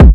Instrument samples > Synths / Electronic
Main Kick
base, base-drum, basedrum, bass, bass-drum, bassdrum, drum, drum-kit, drums, kick, kick-drum, kickdrum, kit, one-shot, oneshot, perc, percussion